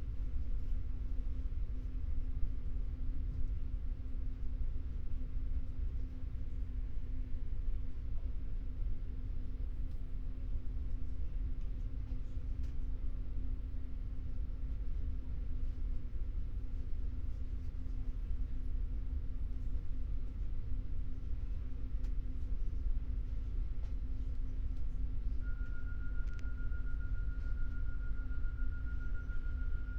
Soundscapes > Other
250710 11h11 TLS Carcasonne
Subject : Traveling from TLS to Carcassonne. Didn't record the entire travel as I was interviewed mid way (why do people fraud? Why should people pay the train?) Date YMD : 2025 July 10. Location : In train Occitanie France. Soundman OKM 1 Weather : Processing : Trimmed in Audacity.